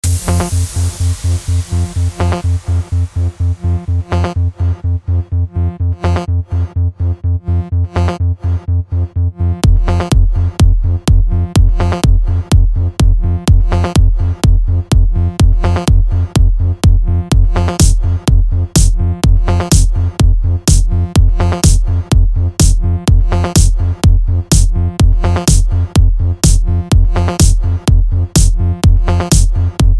Music > Multiple instruments
drums bass 125 bpm
drums bass A simple composition I made with nexus. This composition is fantastic. Ableton live.
125, bass, beat, bpm, drum, drums, loop, percussion, rhythm